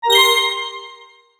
Sound effects > Electronic / Design
Videogame SFX 1
A high-pitched flute sample, playing 5 notes at once
arpegio, chord, videogame